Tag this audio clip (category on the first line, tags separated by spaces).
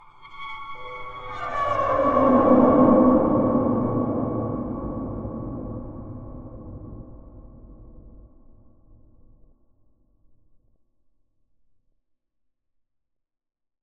Sound effects > Electronic / Design
creepy fall horror misery terror